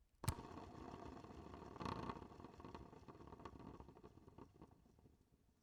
Sound effects > Objects / House appliances
250726 - Vacuum cleaner - Philips PowerPro 7000 series - head wheel spin
7000, aspirateur, cleaner, FR-AV2, Hypercardioid, MKE-600, MKE600, Powerpro, Powerpro-7000-series, Sennheiser, Shotgun-mic, Shotgun-microphone, Single-mic-mono, Tascam, Vacum, vacuum, vacuum-cleaner